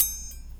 Sound effects > Objects / House appliances
Metal Tink Oneshots Knife Utensil 12
Klang, Clang, Beam, Vibration, Metal, Vibrate, Perc, ting, SFX, FX, Wobble, Foley